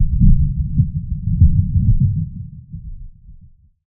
Sound effects > Electronic / Design
UnderWater ExplosionFar
A series of underwater Explosions, Rather Small explosions to be exact And Far, made with Pigments via Studio One, The audio was made using a sample of Rocks Debris
Bomb, Explosion, Far, Rumble, Synthetic, UnderWater